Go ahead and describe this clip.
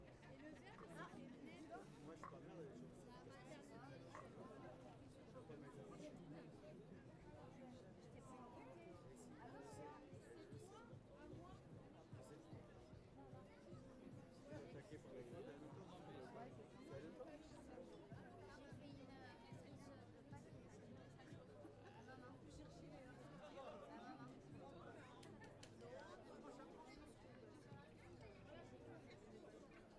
Soundscapes > Urban
250714 22h23 Albi Place du petit poulain - Firework
Subject : Recording the 23:00 fireworks in Albi from Place du petit poulain. Here a long form recording limiter applied. Date YMD : 2025 July 14 Location : Place du petit poulain Albi 81000 Tarn Occitanie France. Sennheiser MKE600 with stock windcover P48, no filter L. Superlux ECM 999 R (with foam windcover). Weather : clear sky, a little wind during the day but I felt none while recording. Processing : Trimmed and about 17db of limiter in Audacity Notes : Sadly, the fireworks were both from the other side of the river, and from the train bridge west. So I set my MKE600 pointing in between the two... 95% were across the river so it was kind of under-used. Around 120 people Tips : Expect a little over 40db of difference between people talking ambience, and the fireworks 150m away.
Sennheiser, 81000, firework, Dual-mic, Superlux, fireworks, Outdoor, Dual-mono, explosion, Omni, 2025, 14, juillet, 14-juillet, MKE600, Tascam, fire-work, Shotgun, rocket, Occitanie, tarn, Albi, FR-AV2, july, ECM999, France, City